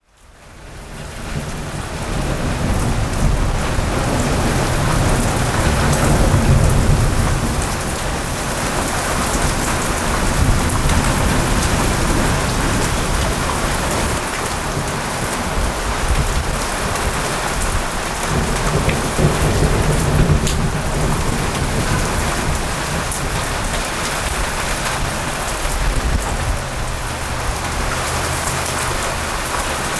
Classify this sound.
Soundscapes > Nature